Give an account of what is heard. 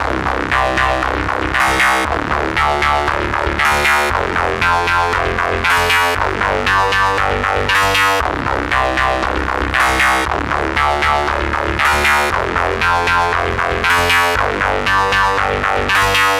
Music > Solo instrument
117 D# Polivoks Brute 09
80s, Analog, Analogue, Brute, Casio, Electronic, Loop, Melody, Polivoks, Soviet, Synth, Texture, Vintage